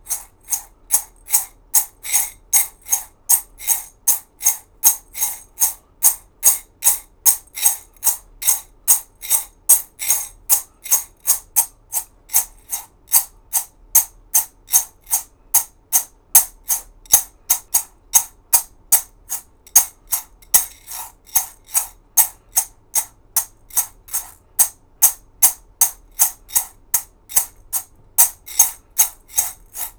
Sound effects > Objects / House appliances
CHAINMvmt-Blue Snowball Microphone, CU Chains, Jumping Out of Ceramic Bowl Nicholas Judy TDC
Chain jumps out of a ceramic bowl.